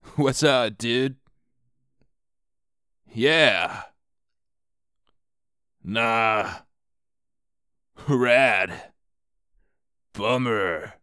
Speech > Conversation / Crowd
Here's some generic NPC dialogue sounds for a cool surfer dude.